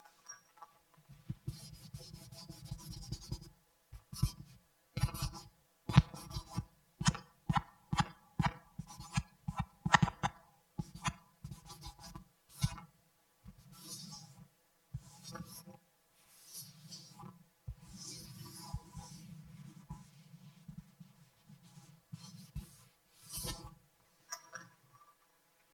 Sound effects > Objects / House appliances
Recording of leather swiping